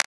Instrument samples > Percussion
Techno-Dirty Snap 1
Retouched Grv Kick 11 from Flstudio original sample pack. Processed with Waveshaper only, I make the slope very shape to make it sounds just leave a crunchy piece, and just stack it.